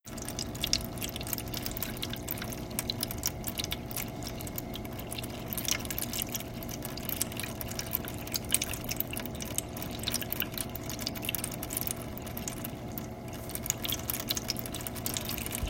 Soundscapes > Indoors
A recording of a pet water fountain running. Both the water stream produced and the whir of the motor and pump can be heard.